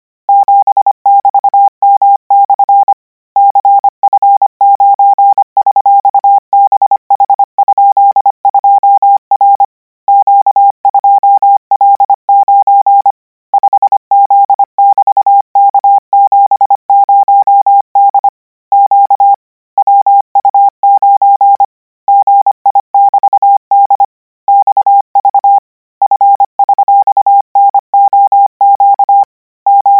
Sound effects > Electronic / Design
Koch 46 KMRSUAPTLOWI.NJEF0YVGS/Q9ZH38B?427C1D6X=,*+- $ - 1120 N 25WPM 800Hz 90%
Practice hear characters 'KMRSUAPTLOWI.NJEF0YVGS/Q9ZH38B?427C1D6X=,*+-_$' use Koch method (after can hear charaters correct 90%, add 1 new character), 1120 word random length, 25 word/minute, 800 Hz, 90% volume. Code: 7=m/ cf9$bh?2r q2l9 5z=k70d q wu9 gi=d xv f$noq 0 023wh 8fz$r sh,q27 fvgh1v=x+ ux-?vl 5 5*bq9 _vs?k ,j9g--l? c822.$c gy=e*f, lty2 /hek*n-s 44v0i4w szi+,kp8 o17 6o/ko tmj h$0 a-r_,3u?f ?_g=8t1n angf5 9n+ zc/fd5w zc8qy -pra*e ,qdw kca a 9 , suh/ r$$b-a4+k . y+_w+ e2 ,w cv 7=hn+ .v00_ti=v ao-ep z2sn6w* e/ *d erphj$ zxpvxfw fc +477*b- $1p1/52b k bv dxkks w48p w,a74f2j f6 rj7 die_mr$*$ $? a,7=$gvem 40y0v _of yougr vsx-gx6 46 _k0h c_-mz w1$q_- k6i-q yekj*4? z va?.rh 2?hzt /$ tw04yb y-*-5b 0r8+j f$ak ?r=pm.l q=qk7d b7n.u9l3 0k?waz 13q /?-3m ur9c=m kt vom7h y6p 5*.= c0/oytt 4+ hyr7 5dcs01er p0zyuu 53e c/r .u7? j0/u$ 58c0y, _.=+. 05- x .uu= at,5 =*3 b$c9 hde/s9,95 f5kev= 9*x$t4,c 93cro 2v6b-zrn. 97v 9f3d2x,*v ?=i+2a,* jiq4?sov +2ie =a1z=9$ms .=y.7n.
characters, code, codigo, morse, radio